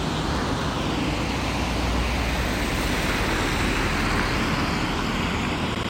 Sound effects > Vehicles
Car going 13
Car sound recorded outdoors in Hervanta, Tampere using an iPhone 14 Pro. Recorded near a city street on a wet surface for a university vehicle sound classification project.
hervanta, road, tampere, outdoor, drive, engine, car